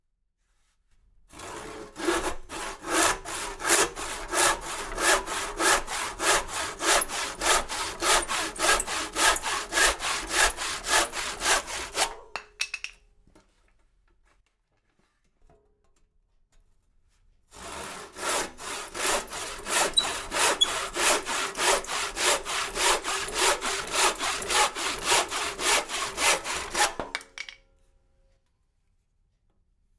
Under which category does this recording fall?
Sound effects > Other mechanisms, engines, machines